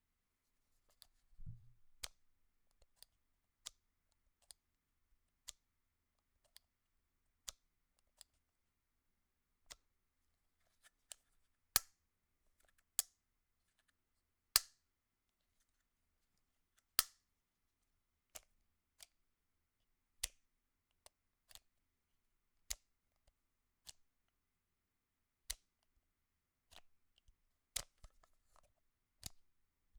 Sound effects > Objects / House appliances
FX Cigarette Lighter 01
Smoke 'em if you get 'em.
zippo, cigarette, smoking, lighter, fx